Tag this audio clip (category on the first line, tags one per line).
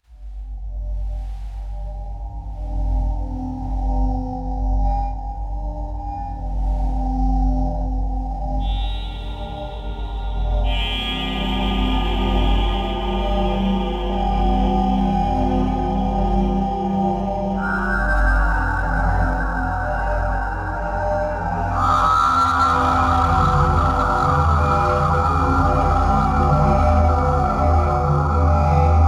Soundscapes > Synthetic / Artificial
drone
spooky
pad
atmosphere
dark
sci-fi
ambience
synth